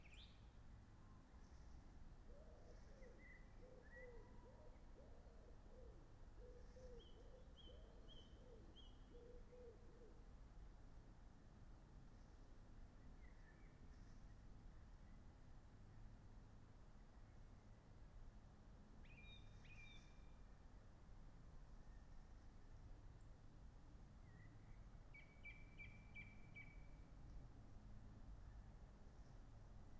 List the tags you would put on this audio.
Soundscapes > Nature
data-to-sound
natural-soundscape
sound-installation
alice-holt-forest
phenological-recording
nature
raspberry-pi
field-recording
modified-soundscape
Dendrophone
artistic-intervention
weather-data
soundscape

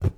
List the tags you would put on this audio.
Sound effects > Objects / House appliances
bucket
carry
clang
clatter
cleaning
container
debris
drop
fill
foley
garden
handle
hollow
household
kitchen
knock
lid
liquid
metal
object
pail
plastic
pour
scoop
shake
slam
spill
tip
tool
water

